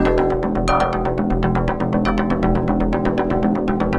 Music > Solo percussion
Industrial Estate 45
120bpm, Ableton, chaos, industrial, loops, soundtrack, techno